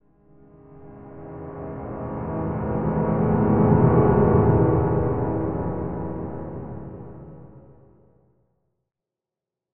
Music > Solo instrument
Horror Crescendo (Lurking Malice)
crescendo, dark-crescendo, fearsome-crescendo, horror-crescendo, horror-hit, horror-impact, horror-riser, horror-stab, horror-sting, scary-crescendo, sinister, sinister-riser, spooky, spooky-chord, spooky-crescendo, spooky-riser